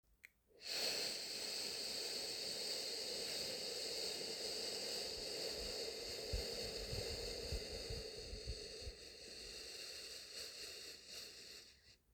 Sound effects > Human sounds and actions
Hissing (by Mouth)
a drawn-out hissing noise
Hiss, Hissing, Voice